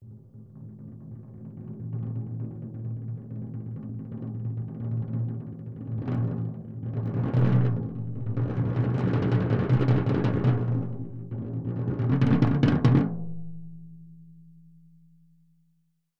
Music > Solo percussion
mid low-tom roll build fx perc 12 inch Sonor Force 3007 Maple Rack
acoustic, beat, drum, drumkit, drums, flam, kit, loop, maple, Medium-Tom, med-tom, oneshot, perc, percussion, quality, real, realdrum, recording, roll, Tom, tomdrum, toms, wood